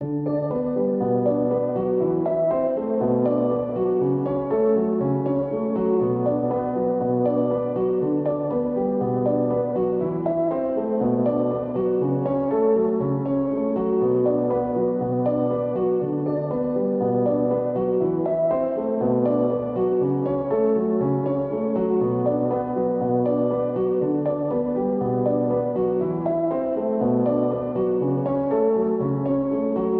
Music > Solo instrument
Piano loops 019 efect 4 octave long loop 120 bpm
120, 120bpm, free, loop, music, piano, pianomusic, reverb, samples, simple, simplesamples